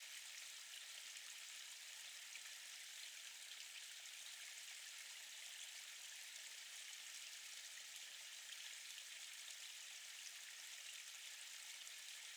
Sound effects > Natural elements and explosions
Waterfall Trickle
Small waterfall in a stream trickling over rocks and sticks. Captured with a Rode NTG-3.
bubbling, creek, flow, flowing, gurgle, liquid, river, splash, splashing, stream, trickle, trickling, water, waterfall